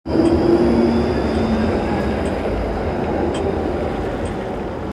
Sound effects > Vehicles
A tram passing by in Tampere, Finland. Recorded on an iPhone 16's built-in microphone. This clip is recorded for the COMP.SGN.120 Introduction to Audio and Speech Processing course project work in Tampere University.
city, public-transport, tram